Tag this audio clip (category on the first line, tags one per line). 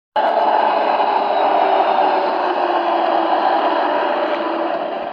Sound effects > Vehicles
traffic
track
tram
field-recording